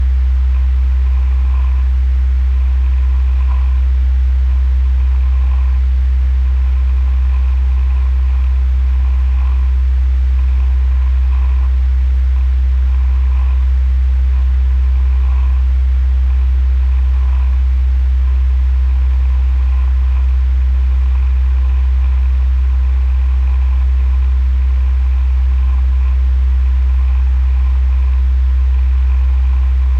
Sound effects > Other mechanisms, engines, machines
Desktop fan (side miced 10cm away) 64hz
Subject : A traditional "big" desktop tabletop fan. 3 bladed 25CMish blade to blade. Date YMD : 2025 July 23 Early morning. Location : France indoors. Sennheiser MKE600 with stock windcover P48, no filter. Weather : Processing : Trimmed and normalised in Audacity.
3-blade, air, buzz, Fan, FR-AV2, hum, humm, Hypercardioid, MKE-600, MKE600, near, noise, Sennheiser, Shotgun-mic, Shotgun-microphone, side-mic, Single-mic-mono, Tascam